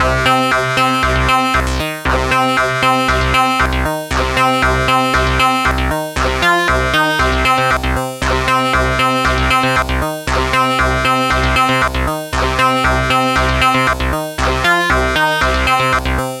Music > Solo instrument
117 D# Polivoks Brute 02
80s, Analog, Analogue, Brute, Casio, Electronic, Loop, Melody, Polivoks, Soviet, Synth, Texture, Vintage